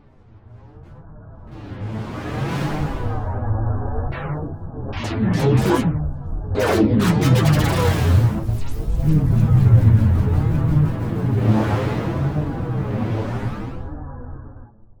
Soundscapes > Synthetic / Artificial
Super fun ambience I recorded. Took a stereo recording of my backyard, did some funny EQ, added a delay plugin, automated a bunch of knob twists, and more or less you get this. Just an idea really.